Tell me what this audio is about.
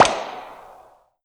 Sound effects > Objects / House appliances
Pop Gun popping.
bop, cartoon, cartoony, classic, comedic, comedy, comic, comical, gag, goofy, joke, playful, plink, pop, pop-gun, popping, pow, retro, silly, slapstick, smack, sound-effect, toon, vintage, wacky, whimsy, zany